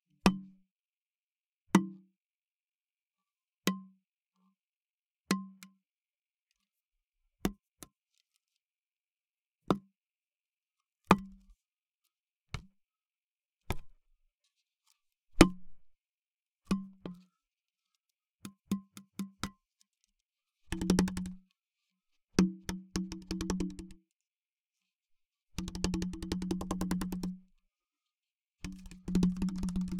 Sound effects > Objects / House appliances
Water Bottle Plastic Bonks and Taps
Flicking your finger against the side of an empty plastic water bottle. Good for layering into comedy sounds.
drop, bouncing, dropping, donk, tapping, plastic, tap, bounce, rattling, water, impact, bonk, bottle, rattle